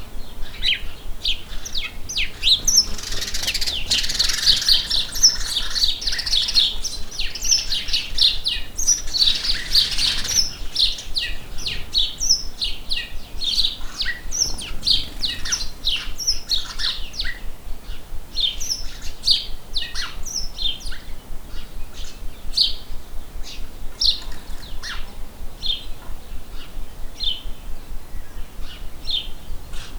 Sound effects > Animals
Subject : Sounds of birds (probably fighting/arguing?) Date YMD : 2025 04 27 15h Location : Gergueil France. Hardware : Zoom H5 stock XY capsule. Weather : Processing : Trimmed and Normalized in Audacity.